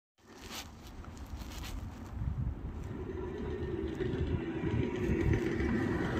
Sound effects > Vehicles
final bus 24
bus, finland